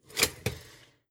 Sound effects > Objects / House appliances
MACHAppl-Samsung Galaxy Smartphone, CU Toaster, Push Down Nicholas Judy TDC
A toaster pushing down.